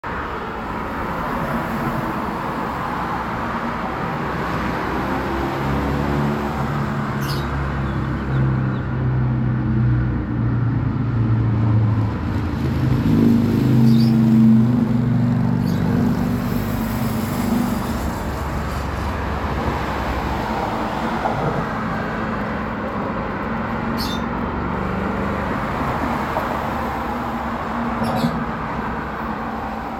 Sound effects > Vehicles
41s cars sound
car, vehicle, vroom